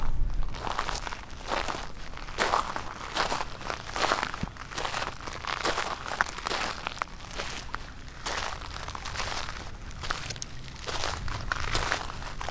Soundscapes > Nature
Footsteps Gravel some wind
wind, field-recording, Footsteps, Australia, nature, bush, Gravel